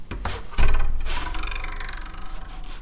Sound effects > Other mechanisms, engines, machines
metal shop foley -125
bam, tink, percussion, sound, thud, crackle, boom, tools, foley, oneshot, pop, bop, wood, fx, shop, sfx, knock, bang, rustle, metal, strike, little, perc